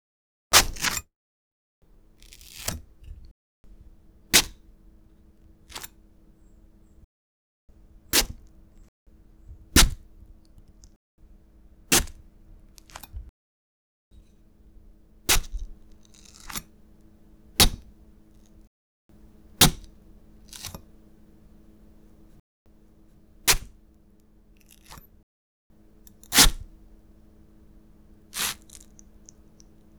Sound effects > Objects / House appliances
sounds of watermelon meat being cut or stabbed with a knife part 2. can work for as a bass or used for punching or kicking characters.
cut, cutting, foley, food, fruit, kitchen, knife, slice, slicing, stab, stabbing, watermelon